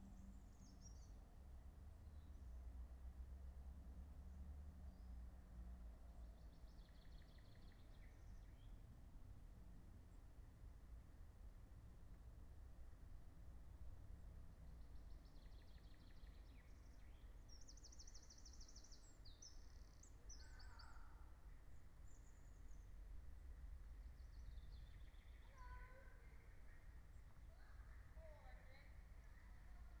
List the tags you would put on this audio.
Soundscapes > Nature
soundscape alice-holt-forest natural-soundscape field-recording nature meadow phenological-recording raspberry-pi

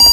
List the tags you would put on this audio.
Sound effects > Electronic / Design
8-bit arcade beep electronic noise Phone-recording

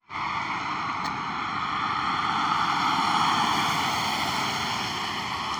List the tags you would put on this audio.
Sound effects > Vehicles
car drive vehicle

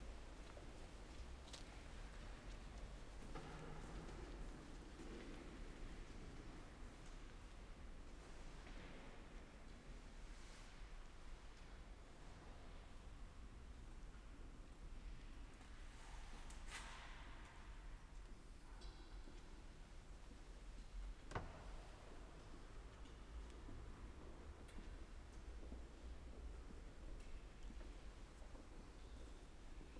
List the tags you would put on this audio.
Soundscapes > Urban
ambiance ambience chiesa church field-recording Italy parroquia quiet reverberation Santa-maria-maggiore Trieste

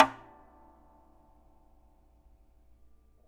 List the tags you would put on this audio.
Sound effects > Objects / House appliances
clunk drill fieldrecording foley fx glass mechanical metal natural oneshot perc percussion sfx stab

